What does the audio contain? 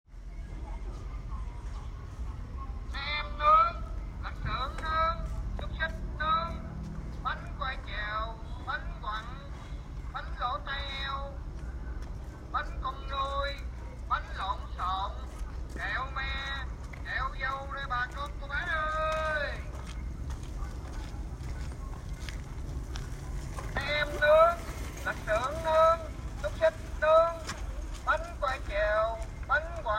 Speech > Solo speech
Man sell food say 'Nem nướng, lạc sườn nướng, xúc xích nướng, bánh quai chèo, bánh quặn, bánh lỗ tai heo, bánh con nuôi, bánh lộn xộn, kẹo mè, kẹo dâu lê cô bác ơi!'. Record use iPhone 7 Plus smart phone 2025.12.02 12:35
man, food, business, male, sell, viet, voice